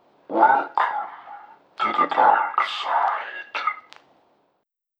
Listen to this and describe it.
Speech > Processed / Synthetic
Recorded "Welcome to the dark side" and distorted with different effects.
dark, distorted, halloween, horror, noise, sfx, vocal